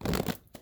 Sound effects > Objects / House appliances
Recorded on my phone (Galaxy Note10+).